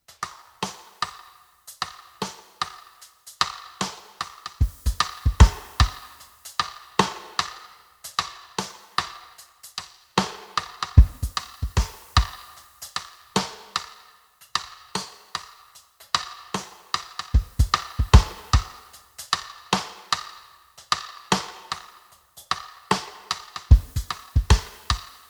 Music > Solo percussion
Live Studio Drums
Short live drum clip. Perfect for an effect.